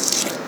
Sound effects > Human sounds and actions
Receipt Scrunch
crush, receipt, scrunch, squish